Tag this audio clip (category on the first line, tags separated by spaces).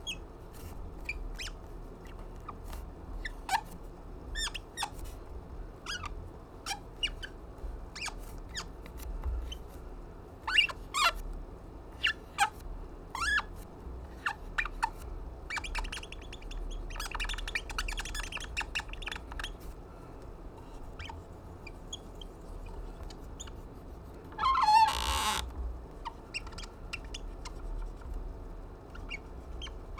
Sound effects > Objects / House appliances
Blue-brand dry-erase-marker marker dry-erase foley squeak dry erase Blue-Snowball